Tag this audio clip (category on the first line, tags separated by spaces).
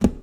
Sound effects > Objects / House appliances
lid shake household handle garden metal slam tip object knock kitchen hollow pour bucket clatter clang liquid water pail cleaning carry fill tool drop debris scoop container plastic spill foley